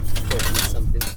Sound effects > Objects / House appliances
Junkyard Foley and FX Percs (Metal, Clanks, Scrapes, Bangs, Scrap, and Machines) 17
Perc, SFX, Foley, waste, Machine, garbage, rattle, Clank, FX